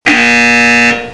Sound effects > Vehicles
This is pickup drop off card tap
synthetic, microsound